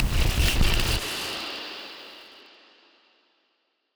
Sound effects > Experimental
snap crack perc fx with verb -glitchid 0015
abstract, clap, crack, edm, fx, glitchy, hiphop, impact, impacts, lazer, perc, pop, sfx, whizz